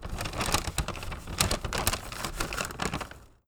Sound effects > Objects / House appliances
OBJBag-Blue Snowball Microphone, CU Paper, Close Top Nicholas Judy TDC
Closing a paper bag top.
bag, Blue-brand, Blue-Snowball, close, foley, paper, top